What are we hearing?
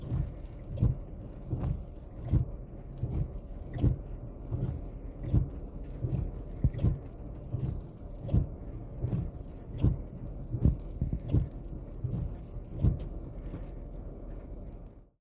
Sound effects > Vehicles
VEHMech-Samsung Galaxy Smartphone, CU Windshield Wipers, Interior Nicholas Judy TDC
Windshield wipers. Interior perspective.
Phone-recording, interior, windshield-wipers